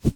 Sound effects > Natural elements and explosions
Whooshing sound, blowing into the microphone
air, wind, blow, whoosh